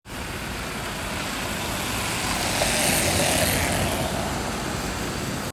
Sound effects > Vehicles
car rain 14
car, engine, rain, vehicle